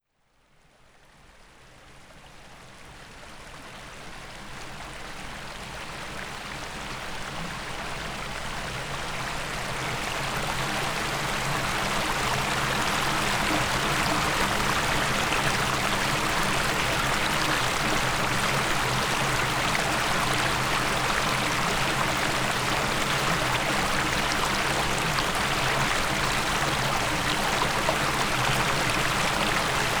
Soundscapes > Urban
A soundwalk around the Diana Memorial Fountain in Hyde Park, London. Water is pumped across a varied surface cut into the granite oval sculpture, flowing fast through narrow channels, splashing over textured surfaces, cascading down steps. Calm ripple sounds, babbling brooks, gushing torrents, turbulent bubbling… Backgrounds sounds include ring-necked parakeets, some low traffic sounds, planes and people talking. Recorded on a Zoom H5 with its standard X/Y capsule.